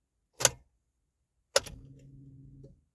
Sound effects > Vehicles
Key Turn without Ignition
Key turning twice, starting electrics, but not starting the engine. Light key turns, quiet electronic hum. Recorded on the Samsung Galaxy Z Flip 3. Minor noise reduction has been applied in Audacity. The car used is a 2006 Mazda 6A.
car, vehicle, car-interior, key